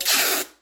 Sound effects > Objects / House appliances
OBJTape-Samsung Galaxy Smartphone, CU Rip Nicholas Judy TDC
A tape rip.
Phone-recording, tape